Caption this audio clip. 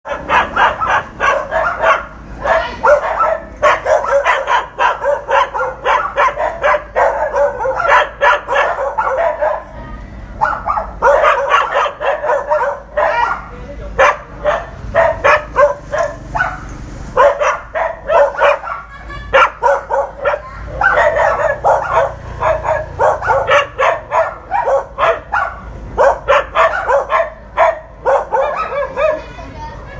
Sound effects > Animals
Ba Con Chó Sủa - 3 Dog Bark
3 dogs bark. Record use iPhone 7 Plus smart phone 2025.12.18 11:00